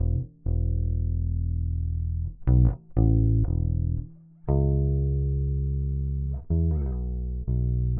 Music > Solo instrument
A simple bass guitar riff
Bass, Guitar, Riff